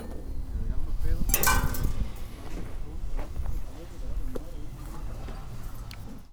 Sound effects > Objects / House appliances
Junkyard Foley and FX Percs (Metal, Clanks, Scrapes, Bangs, Scrap, and Machines) 131
tube, Clank, Ambience, Bang, Smash, Bash, Metallic, Robot, dumpster, waste, rubbish, Dump, Clang, FX, Atmosphere, SFX, rattle, Perc, Environment, dumping, Machine, Metal, Junk, garbage, Robotic, Percussion, trash, Junkyard, scrape, Foley